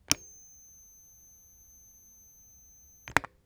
Sound effects > Objects / House appliances
Subject : An electric racket to kill insects. Date YMD : 2025 July 03 Location : Albi 81000 Tarn Occitanie France. Indoors. Sennheiser MKE600 with stock windcover P48, no filter. Weather : Processing : Trimmed in Audacity.